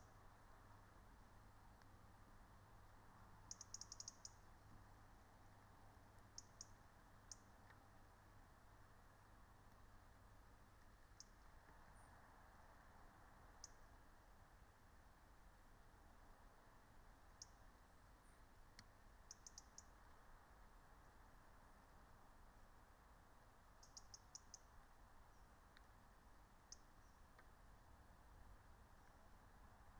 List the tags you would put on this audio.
Soundscapes > Nature
alice-holt-forest
meadow
nature
raspberry-pi
soundscape

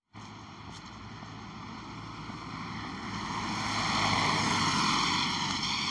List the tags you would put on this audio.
Sound effects > Vehicles
drive
vehicle
car

